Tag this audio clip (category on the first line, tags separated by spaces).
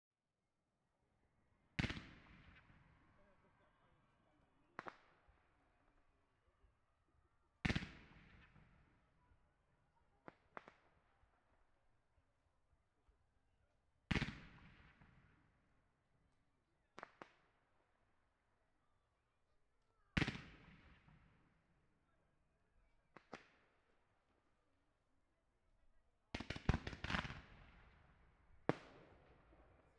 Sound effects > Natural elements and explosions
ambience atmosphere bang bomb bombs boom echo explode explosion explosions field-recording firecrackers fireworks France music Nanterre outdoor rockets soundscape suburban suburbs voices